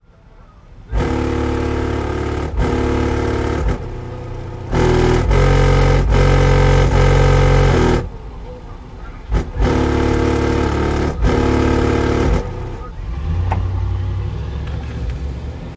Sound effects > Vehicles
The annoying sound of a giant SUV vehicle driving nearby, rattling your vehicle apart with the ridiculously low-end bass blasting through their in-car stereo system.